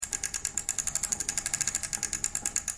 Sound effects > Vehicles
自行车轮咔咔音效
#1：07 nice bird chirp
bicycle, pedaling, rider